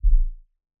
Sound effects > Objects / House appliances
Ribbon Stretch 1 Kick
Playing a stretched satin ribbon like a string, recorded with a AKG C414 XLII microphone.